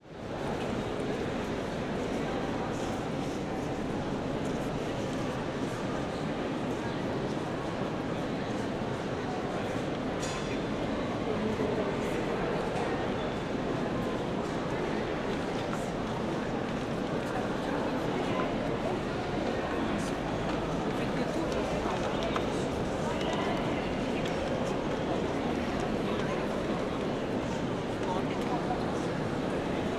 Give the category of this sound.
Soundscapes > Indoors